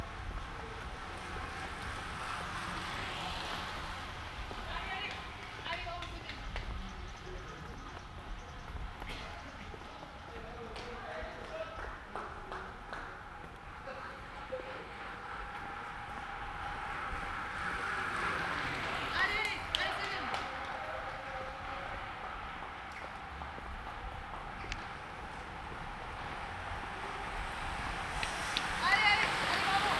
Soundscapes > Urban
250609 09h31 Albi Bd Alsace Lorraine - Walkthough Female Triathlon (bike) - OKM1 Walking
Subject : Walking by while there's a Triathlon event going by. Female cyclists passing by in the walking direction. Date YMD : 2025 06 09 (Monday) 09h31. Location : Bd Alsace Lorraine Albi 81000 Tarn Occitanie France. Outdoors Hardware : Tascam FR-AV2, Soundman OKM1 Binaural in ear microphones. Weather : Clear sky 24°c ish, little to no wind. Processing : Trimmed in Audacity. Probably a 40hz 12db per octave HPF applied. (Check metadata) Notes : That day, there was a triathlon going on. Around 2min I stop by to face the road and let people zoom past. 3 cyclists do so before begin my path again.
OKM1, walking, monday, Tascam, pass-by, Tarn, Soundman, Outdoor, Albi, OKM-1, triathlon, cyclist, Occitanie, In-ear-microphones, bike, France, fpv, 81000, Binaural, ITD, female, 2025, City, june, OKM, FR-AV2, walk